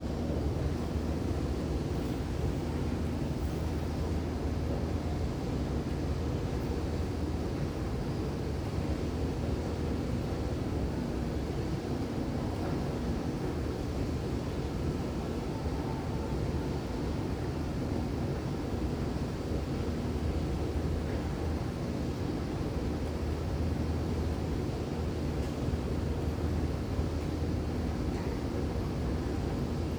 Soundscapes > Urban
Toronto Pearson Airport Ambience, 3am, Escalator, Quiet Drone - Toronto, ON